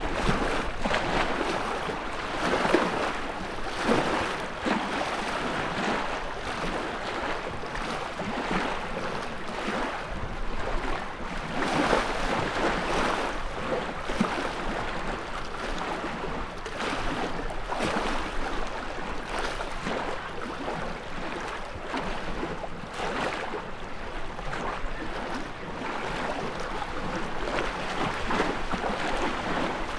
Soundscapes > Nature
lake shore-day-waves 1
Ambient recording in a lake shore. Medium wind. Some seagulls and dogs might be heard.